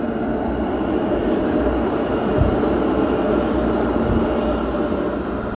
Soundscapes > Urban
ratikka2 copy
traffic, tram, vehicle